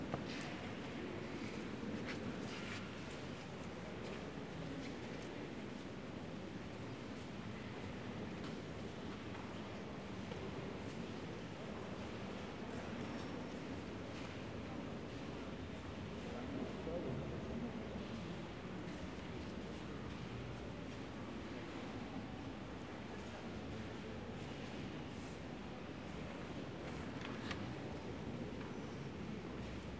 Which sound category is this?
Soundscapes > Indoors